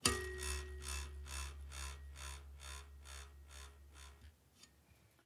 Sound effects > Other mechanisms, engines, machines
Heavy Spring 04
garage, noise, spring